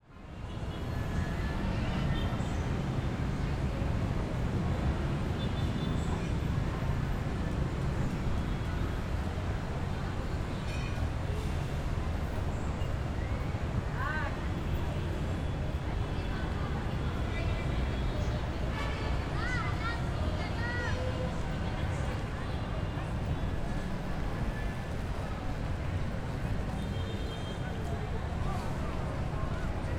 Soundscapes > Urban
Quiapo, Manila Philippines
The Old Downtown of Manila known for the Black Nazarene where devotion and chaos coexist, chants rise over traffic and vendors calling out their wares. It’s faith in motion. Specific sounds you can hear: church masses, vehicle sounds, bargaining voices, people chatter transitioning to a quieter side.
People, Market, Manila, Quiapo, Street, Jeepneys, Vehicles, Urban, Field-Recording, Church